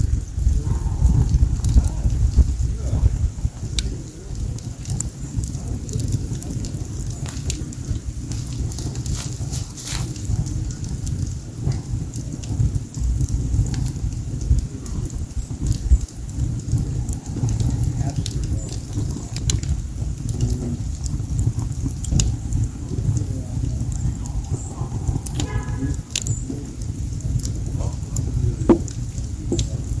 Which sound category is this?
Sound effects > Natural elements and explosions